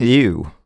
Speech > Solo speech
Displeasure - Eww
Tascam, NPC, oneshot, Sound, displeasured, singletake, Male, Voice-acting, Single-take, Neumann, FR-AV2, disgusted, eww, U67, talk, Video-game, Man, Human, gross, unpleased, Vocal, voice, grosse, dialogue, displeasure, Mid-20s